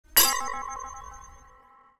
Sound effects > Other
combo lightning dark
39 - Combined Lightning and Dark Spells Sounds foleyed with a H6 Zoom Recorder, edited in ProTools together
combination, dark, lightning, spell